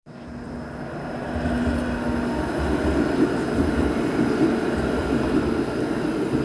Soundscapes > Urban
voice 22-11-2025 1 tram
What: Tram passing by sound Where: in Hervanta, Tampere on a cloudy day Recording device: samsung s24 ultra Purpose: School project
Rattikka, TramInTampere, Tram